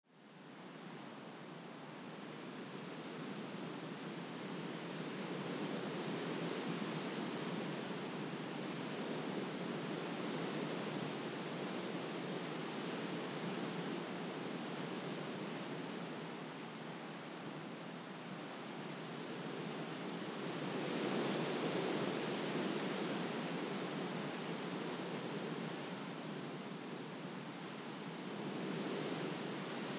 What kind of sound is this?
Sound effects > Natural elements and explosions
gusts on coniferous tree 2
britany, pine, coniferous, gusts